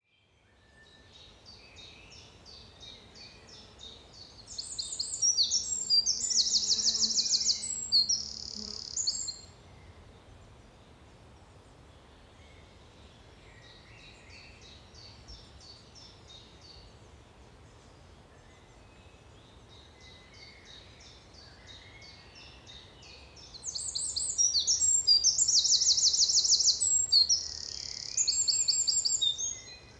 Soundscapes > Nature
A morning recording at George Hayes Staffordshire Wildlife Trust site.
ambience, birds, day, field, recording, time